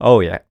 Speech > Solo speech
Cocky - Oh yeah
talk, Neumann, cocky, singletake, Mid-20s, Male, Video-game, Voice-acting, smug, voice, FR-AV2, Human, words, U67, Man, Tascam, Single-take, Vocal, NPC, oneshot, dialogue